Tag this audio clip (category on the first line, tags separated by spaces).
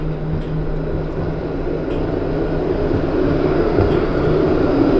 Sound effects > Vehicles
transportation tramway vehicle